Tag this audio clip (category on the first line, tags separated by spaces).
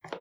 Sound effects > Human sounds and actions
walk shoe walking common footstep step foot floor foley feet